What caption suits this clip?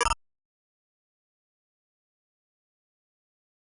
Sound effects > Electronic / Design
Glitch (Faulty Core) 9
audio-glitch, audio-glitch-sound, audio-glitch-sound-effect, computer-error, computer-error-sound, computer-glitch, computer-glitch-sound, computer-glitch-sound-effect, error-fx, error-sound-effect, glitches-in-me-britches, glitch-sound, glitch-sound-effect, machine-glitch, machine-glitching, machine-glitch-sound, ui-glitch, ui-glitch-sound, ui-glitch-sound-effect